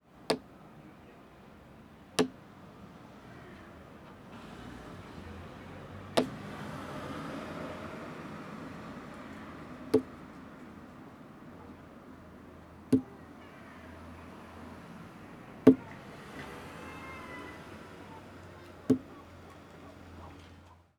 Soundscapes > Urban
Splott - Cone Taps Cars Seagulls - Railway Street
fieldrecording, splott, wales